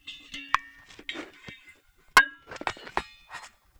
Sound effects > Experimental
contact mic in metal thermos, empty handling2
The sound of a contact microphone moving around inside a large thermos
contact-mic, contact-microphone, experimental, thermos, water, water-bottle